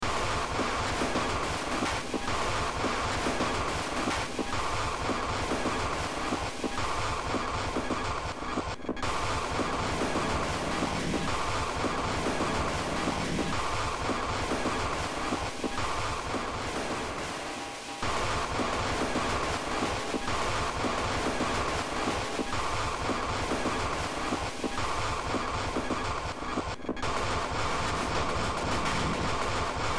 Music > Multiple instruments
Short Track #3874 (Industraumatic)
Sci-fi, Noise, Horror, Underground, Cyberpunk, Ambient, Industrial, Games, Soundtrack